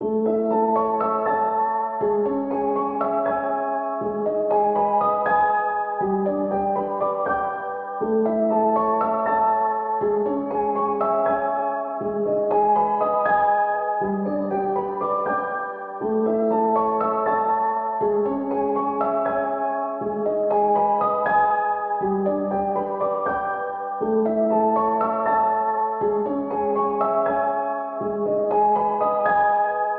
Music > Solo instrument
Piano loops 104 efect 4 octave long loop 120 bpm
120, 120bpm, free, loop, music, piano, pianomusic, reverb, samples, simple, simplesamples